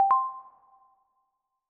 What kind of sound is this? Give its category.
Sound effects > Electronic / Design